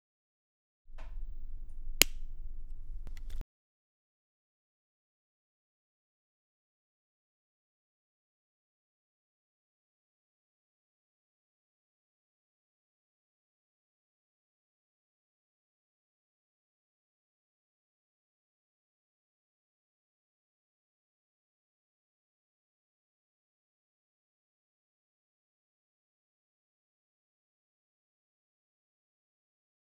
Soundscapes > Other
pen click
pen cap closing to imitate light switch (foley)
chains, foley, keys